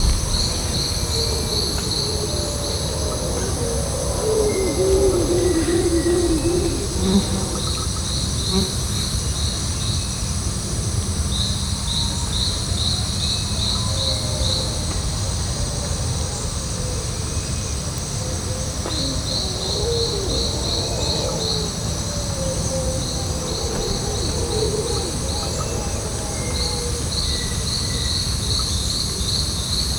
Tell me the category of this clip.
Soundscapes > Nature